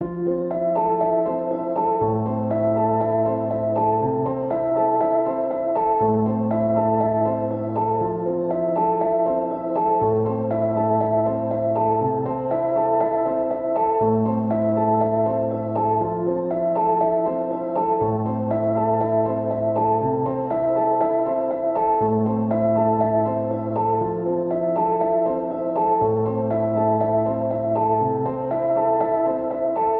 Music > Solo instrument
Piano loops 026 efect 4 octave long loop 120 bpm
samples, 120bpm, pianomusic, simple, 120, reverb, loop, piano, free